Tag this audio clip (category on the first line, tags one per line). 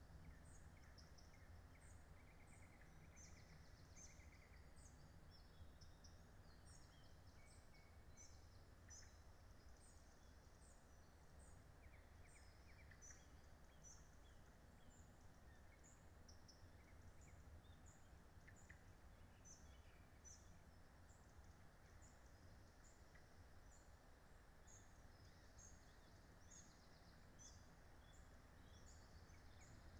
Soundscapes > Nature
modified-soundscape
soundscape